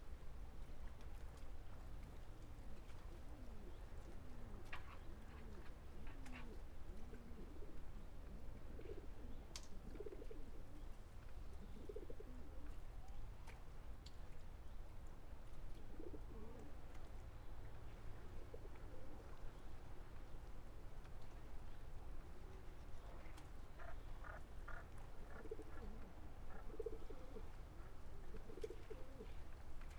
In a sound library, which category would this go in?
Soundscapes > Urban